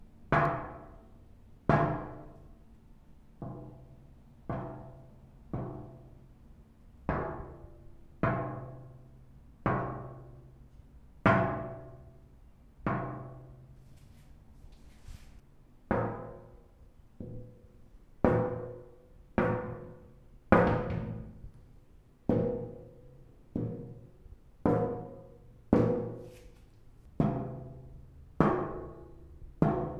Sound effects > Objects / House appliances
knocking on a glass door with knuckle and finger
Knocking on the glass panels of a shower box. Recorded with Zoom H2.
door, glass, knock, panel, surface